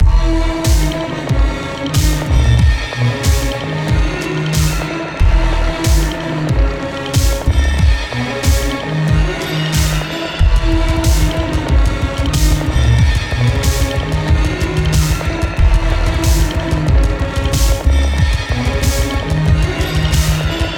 Music > Multiple instruments
Bouncy arabesque beat with delayed chimes throughout. Features heavily modified samples from PreSonus loop pack included in Studio One 6 Artist Edition